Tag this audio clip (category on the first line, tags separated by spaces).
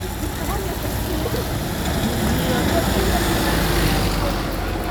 Soundscapes > Urban
bus transportation vehicle